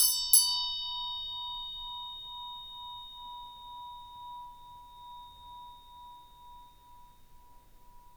Instrument samples > Other
tibetan bowls tibetan bowls Recorded with sounddevices mixpre with usi microphones
bowls, tibet, tibetan